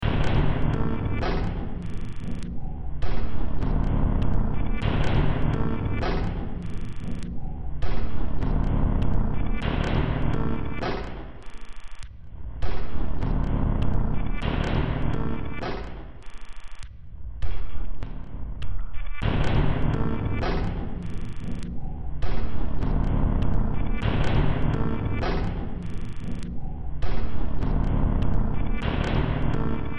Music > Multiple instruments
Demo Track #3841 (Industraumatic)
Ambient, Sci-fi, Underground, Soundtrack, Noise, Horror, Industrial, Games, Cyberpunk